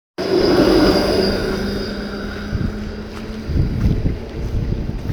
Soundscapes > Urban
Tampere tram recording
recording, Tampere, tram